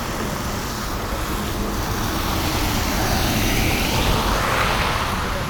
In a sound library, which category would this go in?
Sound effects > Vehicles